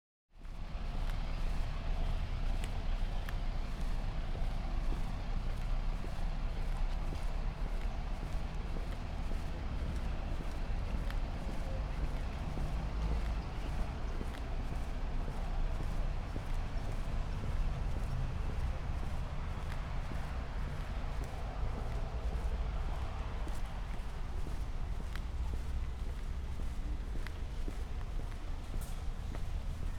Soundscapes > Urban
Walking through the hall and corridor under the station platforms - Warsaw-East International Railway Station
Tascam DR680 Mk2 and two Audio-Technica U851